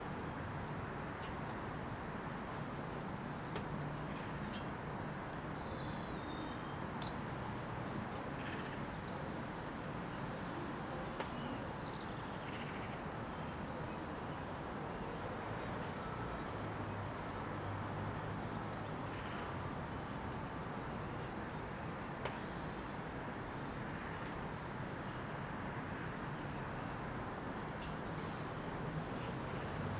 Soundscapes > Other
Suburban soundscape recorded from about 07:50 (UTC) on 21/01/2026 in the UK. This is a mixture of anthropophony (throughout and includes, aircraft, road sounds and others), biophony (birds) and geophone (wind, including some wind chimes at time). This was part of a nocturnal migration (NocMig) setup used to monitor the birds passing near the recording location. Single mic (clippy) with a fluffy as the only protection and a Zoom H1essential recorder. Birds that can be heard include (note that some are more obvious than others!): Magpie Robin Wood pigeon Feral pigeon Blue tit Dunnock Carrion crow Grey wagtail Redwing Wings can also be heard at times. Weather: light rain with gentle gusts of wind and temperature of about 7 or 8 C.